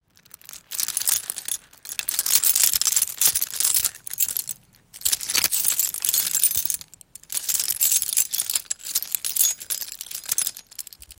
Sound effects > Objects / House appliances
atslēgas / keys
closeup, household, item, recording